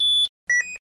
Sound effects > Vehicles
Seatbelt Chime / Alarm
A seatbelt chime from a Peugeot 207.
Seatbelt, Chime, Peugeot